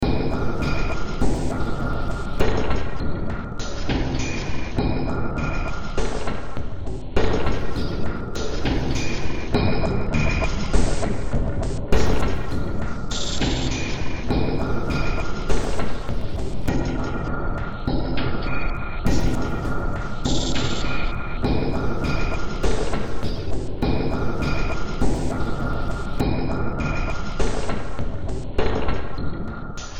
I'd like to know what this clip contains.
Music > Multiple instruments
Demo Track #3180 (Industraumatic)
Cyberpunk, Games, Horror, Industrial, Soundtrack, Ambient, Underground, Noise, Sci-fi